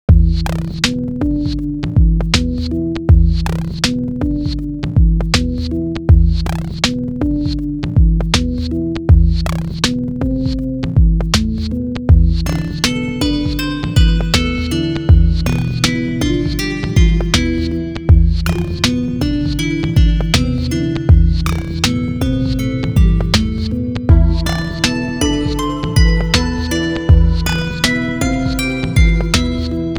Music > Multiple instruments
Ancient Times Trip Hop Beat and Melody 80bpm
a chill downtempo trip hop beat created with Microtonic, Chromaphone, and FL Studio